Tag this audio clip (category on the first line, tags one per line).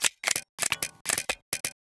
Music > Multiple instruments
128
music
strange
click
128bpm
handling
128-bpm
simple
gun
bpm
touch
clicking
weird
glock
firearm
glock17
minimal
glock-17
loop
metal
beat
pistol